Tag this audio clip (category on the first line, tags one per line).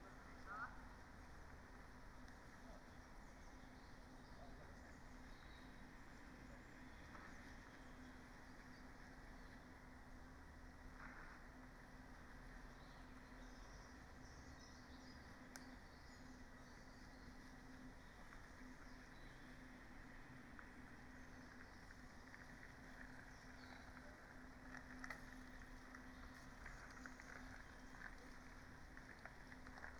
Soundscapes > Nature
Dendrophone
field-recording
modified-soundscape
natural-soundscape
raspberry-pi
sound-installation
soundscape
weather-data